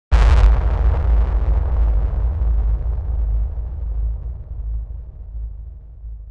Instrument samples > Synths / Electronic
Sample used Grv Kick 26 from FLstudio original sample pack. Processed with Fruity Limiter and Misstortion.